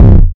Instrument samples > Percussion
BrazilFunk Kick 28
Retouched WhoDat Kick from flstudio original sample pack. Just tweaked the Boost amount from flstudio sampler. Then just did some pitching work and tweaked the pogo amount randomly. Processed with ZL EQ, OTT, Waveshaper.
BrazilFunk, Distorted, BrazilianFunk, Kick